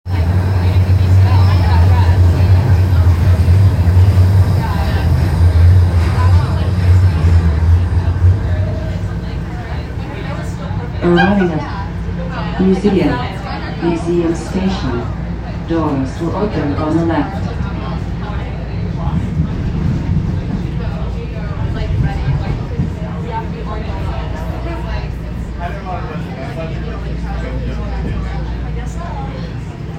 Soundscapes > Urban
TTC Subway Line 1 Ambience - Museum to Union
TTC Subway train ride from Museum to Union. Ambience, including next station announcements. Recorded with iPhone 14 Pro Max, on September 13 2025.
ambience, announcement, subway, toronto, transit, ttc, voices